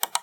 Sound effects > Objects / House appliances
Middle mouse button click
Short recording of a computer mouse, I cleaned it up a bit to remove background noise.
click, computer, mouse